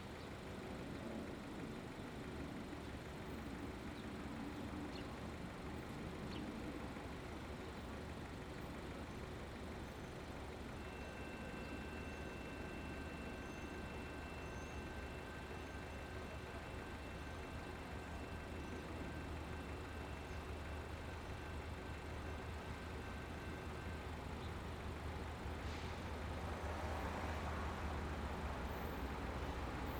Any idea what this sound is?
Soundscapes > Other
Passing Freight (tank cars) Train 2 81625
Another field recording of a passing train in Ridgefield Park, NJ. This one is a train consisting of empty Ethanol tank cars. A nearby railroad crossing's electronic bell can be heard as the train approaches. After the train passed I let the recorder run a bit to get the sounds of the last car's "thumping" wheels as the train departs. Recorded with a Zoom H6 Essential recorder. Edited with AVS Audio Editing software.